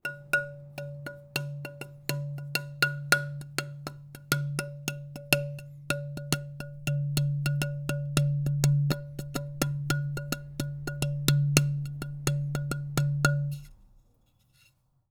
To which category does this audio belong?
Music > Solo instrument